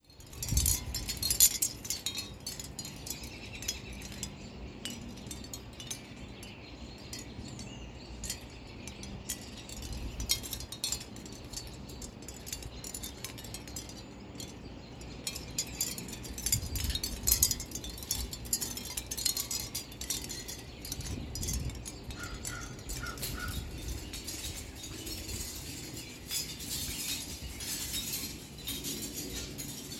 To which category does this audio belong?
Music > Solo percussion